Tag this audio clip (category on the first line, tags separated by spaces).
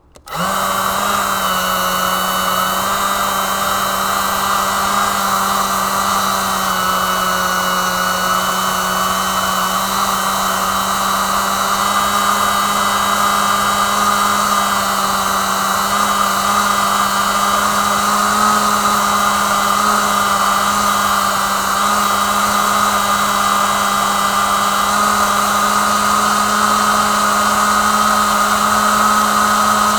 Sound effects > Objects / House appliances
Blue-brand Blue-Snowball bubble bubble-machine fan machine motor run turn-off turn-on